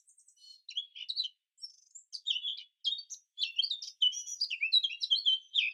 Sound effects > Animals
A morning recording of an Eurasian Blackcap. Edited in Rx11.
nature, songbird